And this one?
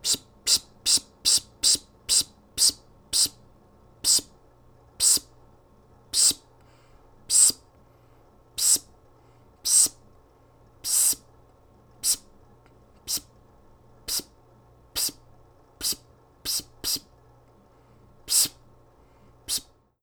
Sound effects > Human sounds and actions
AIRHiss-Blue Snowball Microphone, CU Short, Imitation, Comical Nicholas Judy TDC
Air - short hisses, Human imitation. Comical.
short, imitation